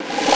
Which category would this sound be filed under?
Music > Solo percussion